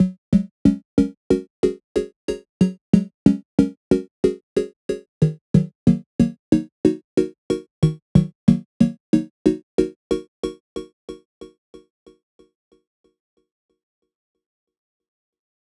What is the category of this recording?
Instrument samples > Synths / Electronic